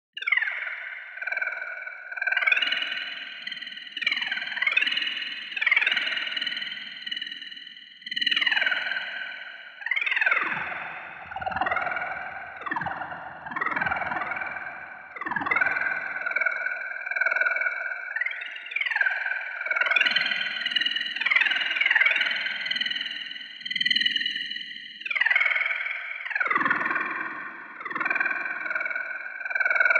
Sound effects > Electronic / Design
Weird monster sounds i created in DAW; you can use your imagination to where this "animal" could live and use it for horror stuff or maybe combine it with some ambients. This is WET version with added Reverb